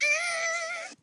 Speech > Other
this sound came out of brothers mouth, not mine. if you pitch it down it sounds more feminine and less nasal.